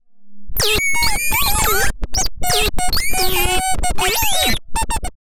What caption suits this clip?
Sound effects > Electronic / Design
Optical Theremin 6 Osc Destroyed-026
Alien, Analog, Bass, Digital, DIY, Dub, Electro, Electronic, Experimental, FX, Glitch, Glitchy, Handmadeelectronic, Infiltrator, Instrument, Noise, noisey, Optical, Otherworldly, Robot, Robotic, Sci-fi, Scifi, SFX, Spacey, Sweep, Synth, Theremin, Theremins, Trippy